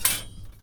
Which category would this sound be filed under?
Sound effects > Objects / House appliances